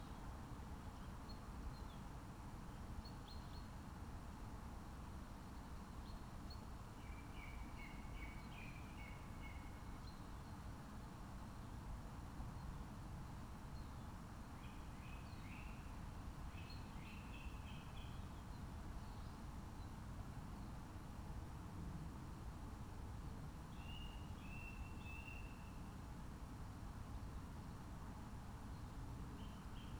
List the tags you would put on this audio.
Soundscapes > Nature
natural-soundscape
raspberry-pi
meadow
nature
phenological-recording
soundscape
alice-holt-forest
field-recording

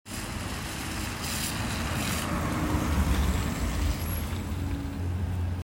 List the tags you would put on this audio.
Sound effects > Vehicles
vehicle
rain
Tampere

